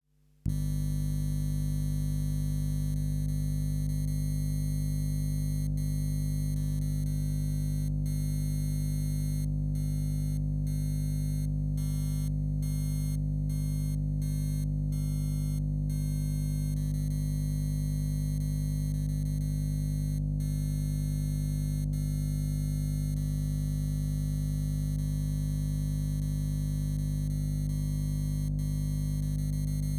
Sound effects > Electronic / Design
Vintage calculators, Sharp EL-8131 and Mera 144 - EMF Recording
The sound of vintage calculators with a VFD display. First recording: Vintage big desktop Polish calculator MERA-ELWRO model 144 Powered by 230V only. No battery option. Second recording: Vintage Japanese calculator SHARP Elsi Mate EL-8131 Powered by two AA batteries or an external 6V power supply Tascam DR100 Mk3 and vintage Matsushita National RP-963 coil transducer.